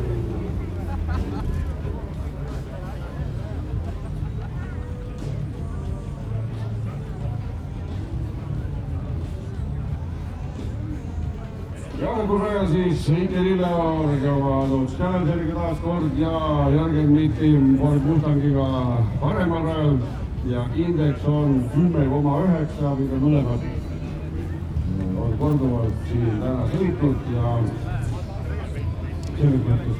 Soundscapes > Other
Wildcards Drag Race 2025
Audix, Drag, Measurement, Microphone, Race, TM1, Wildcards